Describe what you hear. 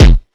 Instrument samples > Synths / Electronic
"DO SHONCI" Kick
bassdrum, drums, hit, kick, kick-drum